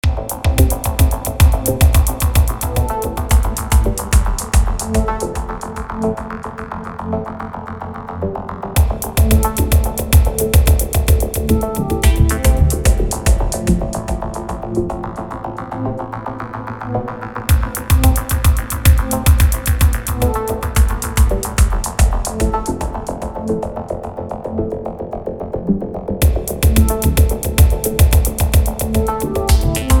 Music > Solo instrument
Melody loop 110 bpm A simple composition I made with nexus. This composition is fantastic. Ableton live.